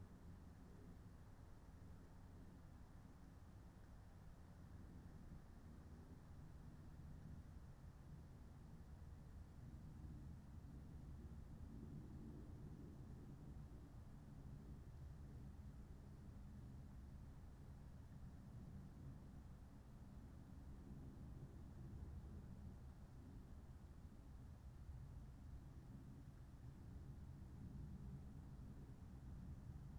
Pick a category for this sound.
Soundscapes > Nature